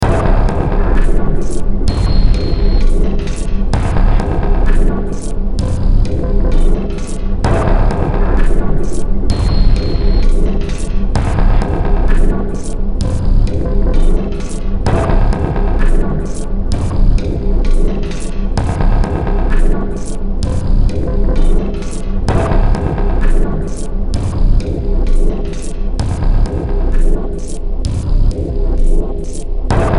Music > Multiple instruments
Demo Track #4029 (Industraumatic)
Underground, Horror, Sci-fi, Soundtrack, Noise, Industrial, Cyberpunk, Ambient, Games